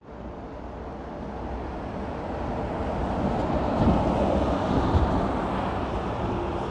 Soundscapes > Urban
Bus driving by recorded on an iPhone in an urban area.
bus, transport, vehicle